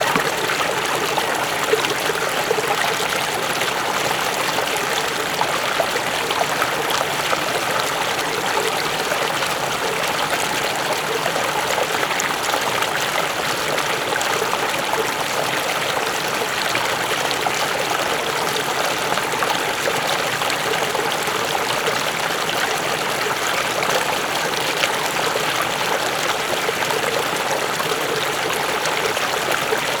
Soundscapes > Nature
Stream - Flowing, bubbling
A small stream bubbling down the mountain. Recorded with a Zoom H2n in 4 channel surround mode
babbling, brook, bubbling, creek, flow, flowing, gurgle, gurgling, liquid, relaxing, river, splash, stream, trickle, water